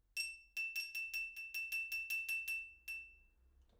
Sound effects > Other
Glass applause 2
Rode, cling, solo-crowd, Tascam, wine-glass, person, individual, stemware, clinging, XY, single, glass, NT5, applause, indoor, FR-AV2